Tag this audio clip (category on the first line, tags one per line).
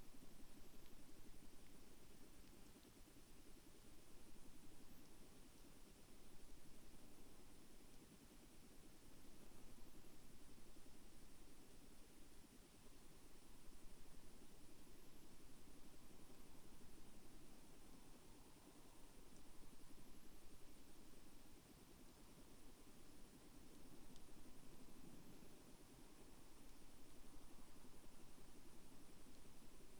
Soundscapes > Nature
weather-data
nature
Dendrophone
field-recording
natural-soundscape
modified-soundscape
phenological-recording
soundscape
sound-installation
alice-holt-forest
data-to-sound
artistic-intervention
raspberry-pi